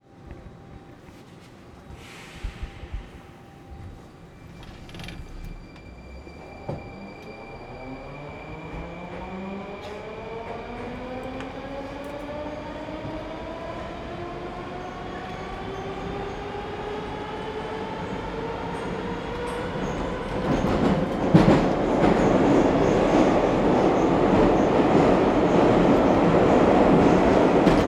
Soundscapes > Urban
TRNInt-XY Zoom H4e Subway station atmosphere SoAM Sound of Solid and Gaseous Pt 1 almost only trains
acoustic, announcement, field-recording, metro, station, subway, train, underground